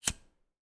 Sound effects > Objects / House appliances
Striking a yellow BIC lighter in a room next to a window on a cloudy day without rain
flame, light, lighter, striking